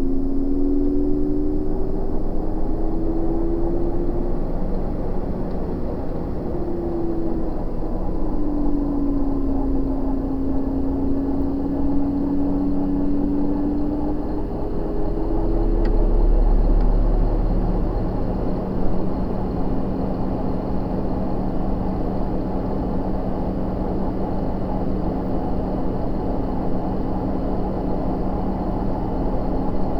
Sound effects > Objects / House appliances
portable AC unit contact mic
I recording of the portable ac in my house using a Metal Marshmallow Pro
ac, appliance, contact, industrial